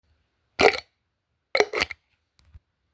Sound effects > Objects / House appliances
Small empty jar open & close
A jar opening and closing. Crazy I know
opening, Jar, closing